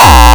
Instrument samples > Percussion
Gabbar Kick 5 Crispy
kick hardstyle oldschool hardcoreRetouched multiple kicks in FLstudio original sample pack. Processed with ZL EQ, Waveshaper.
hardcore, hardstyle, kick, oldschool